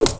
Sound effects > Human sounds and actions
FGHTImpt-Blue Snowball Microphone, CU Swish, Face Slap Nicholas Judy TDC
A swish and face slap.